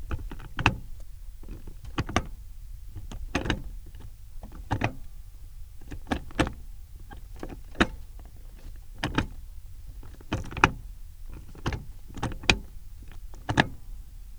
Sound effects > Vehicles
Ford 115 T350 - Gearstickwav
Mono, Ford-Transit, France, 2025, FR-AV2, Tascam, Ford, T350, August, 2003-model, Vehicle, A2WS, 2003, Single-mic-mono, Van